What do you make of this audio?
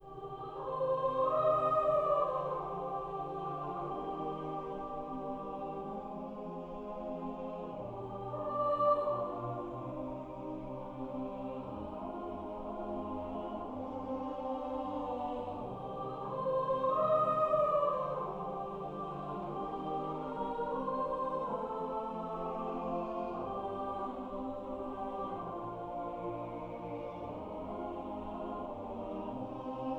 Music > Multiple instruments
Simple Magestic Choir Melody (C minor, ~95 BPM)
A single rough and simple melody sang by a virtual choir that I made and probably won't use, It is not good but it may be useful to someone, who knows ? You may use it without crediting me, only credit if you feel like it. Have a good day !
95BPM, Choir, Cm, Epic, Magestic, Melodic, Strings, Violin